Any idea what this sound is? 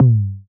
Instrument samples > Synths / Electronic
A tom one-shot made in Surge XT, using FM synthesis.
surge, synthetic, fm, electronic